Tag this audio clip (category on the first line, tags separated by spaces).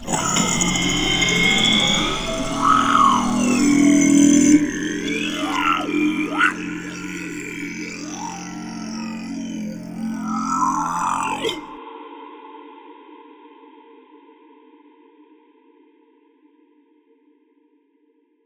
Sound effects > Experimental
Vocal visceral devil Fantasy scary Growl demon Snarl Vox Snarling Echo Groan Creature fx boss evil Deep Animal Monstrous gamedesign Sounddesign Monster Frightening Ominous Alien sfx gutteral Otherworldly Sound Reverberating